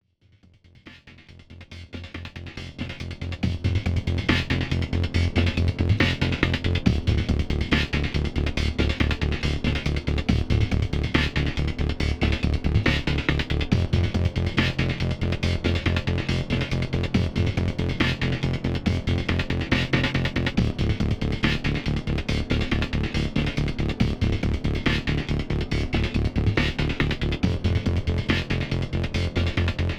Music > Multiple instruments
forcedentry-01 tense SWAT breakbeat music 140bpm
140bpm breakbeat in the style of the soundtrack from "Ready or Not". Pulsing bass mixed with a heavily chopped and distorted drum breakbeat. Made in FL Studio with OTT, EQ, and ShaperBox.
140bpm, bass, breakbeat, breakcore, OST, police, pulsing, ready-or-not, SWAT